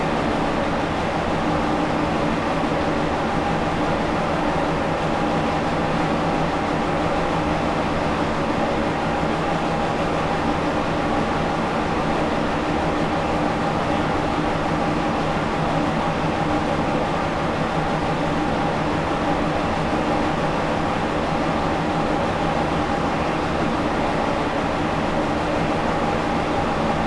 Sound effects > Other mechanisms, engines, machines
250812 105554 PH Large ventilation system
Large ventilation system. (loopable) I recorded this large ventilation system from the fitting room of Unitop department store, located near Calapan city (Oriental Mindoro, Philippines). Recorded in August 2025 with a Zoom H6essential (built-in XY microphones).